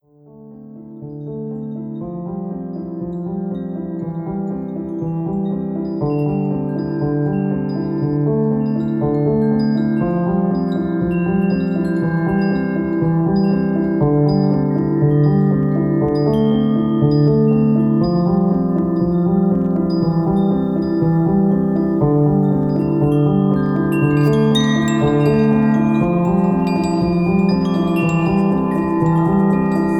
Instrument samples > Other
preview for oblivion mod
oblivion, skyrim, elderscrolls